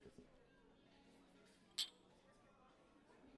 Sound effects > Objects / House appliances
Shot glasses clink.
glass, toast, glasses